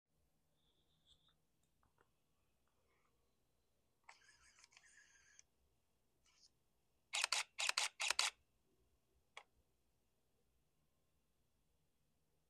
Sound effects > Electronic / Design
A Nikon 2012 professional camera takes three camera shots.
2012, camera, nikon, professional, shutter